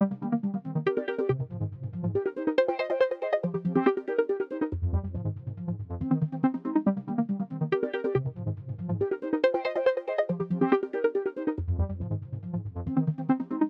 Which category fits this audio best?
Music > Solo instrument